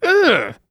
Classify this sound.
Speech > Other